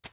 Sound effects > Objects / House appliances
Sound used originally for the action of putting something in a paper bag. Recorded on a Zoom H1n & Edited on Logic Pro.